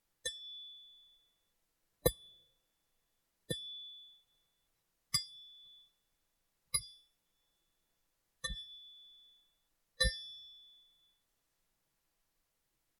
Sound effects > Objects / House appliances
Knife sound recording